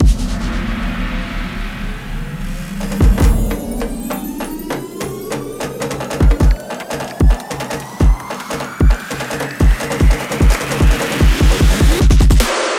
Instrument samples > Percussion
FILTH Build 150BPM
build, drum, riser